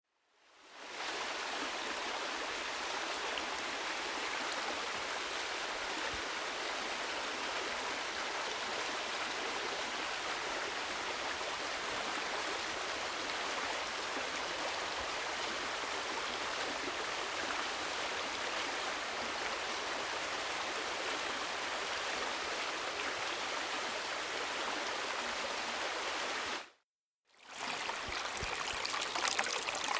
Soundscapes > Nature
water-in-stream-16801
relaxing water flowing through a stream
liquid, relaxing, water